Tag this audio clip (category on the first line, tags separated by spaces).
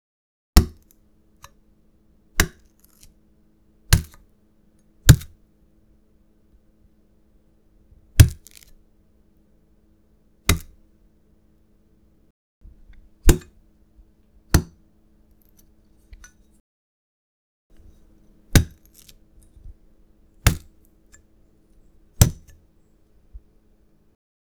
Sound effects > Objects / House appliances
punch stab slice